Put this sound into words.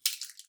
Instrument samples > Percussion
Cellotape Percussion One Shot14
adhesive, ambient, cellotape, cinematic, creative, design, DIY, drum, electronic, experimental, foley, found, glitch, IDM, layering, lo-fi, one, organic, pack, percussion, sample, samples, shot, shots, sound, sounds, tape, texture, unique